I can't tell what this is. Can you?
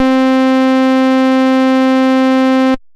Instrument samples > Synths / Electronic
02. FM-X ALL 1 SKIRT 7 C3root
FM-X, MODX, Montage, Yamaha